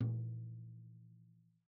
Music > Solo percussion
Med-low Tom - Oneshot 26 12 inch Sonor Force 3007 Maple Rack
Sample from a studio recording at Calpoly Humboldt in the pro soundproofed studio of a medium tom from a Sonor 3007 maple rack drum, recorded with 1 sm57 and an sm58 beta microphones into logic and processed lightly with Reaper
acoustic, beat, drum, drumkit, drums, flam, kit, loop, maple, Medium-Tom, med-tom, oneshot, perc, percussion, quality, real, realdrum, recording, roll, Tom, tomdrum, toms, wood